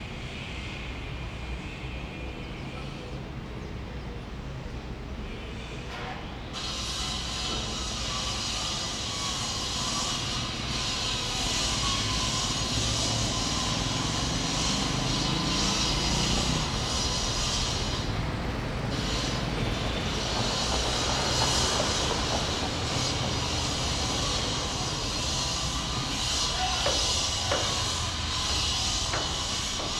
Soundscapes > Urban
Sitio de construccion desde la ventana, a 50m. Construction site from my window, about 50m away Recorded on Zoom F6 with Behringer C2 pair on ORTF